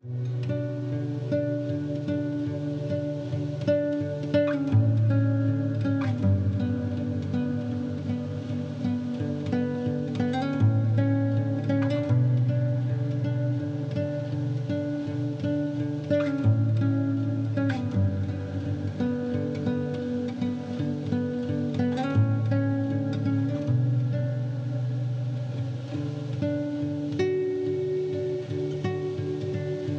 Music > Solo instrument
This music is inspired by the feelings of Chloe in Life is Strange, I always felt a sense of connection to some of her feelings and it made me make a song for that feelings!, The music is Recorded with a Poco X3 Mobile Phone and processed through native studio one, valhalla Reverb & Delay plugins.
Ambience, ambient, Atmospheric, Delay, Emotional, Guitar, Music, Reverb, Sorrowful, Soundtrack